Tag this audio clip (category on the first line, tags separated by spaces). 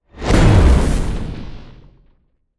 Sound effects > Other
blunt sharp impact power percussive crash heavy transient force collision shockwave rumble hard sound game audio effects hit sfx smash cinematic design thud explosion strike bang